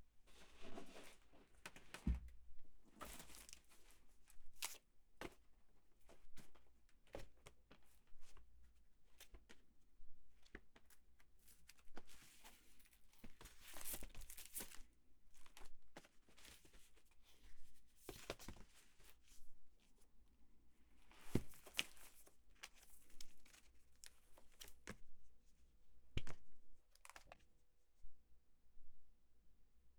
Sound effects > Other
Handling vinyl records
Flipping through vinyl records, turning the cover, removing record from sleeve, flipping vinyl disc in hand. Hojeando discos de vinilo, volteado la carátula, sacando el vinilo de la manga, volteando vinilo.
vinilo, disco, Handling, Longplay, Vinyl, LP, records